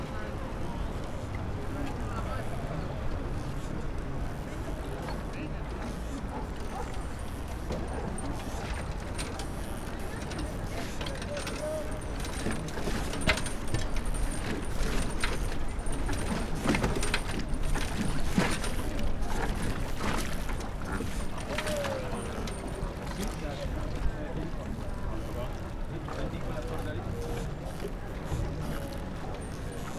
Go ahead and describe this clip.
Soundscapes > Urban
River Pier
the sound of a moving pier caused by the passage of a small boat. Recorded with a Zoom H1essential.
field-recording, wood, waves, pier, trickle, splash, relaxing, nature, ambient